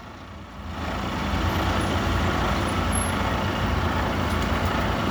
Sound effects > Vehicles
Bus sound in tampere hervanta finland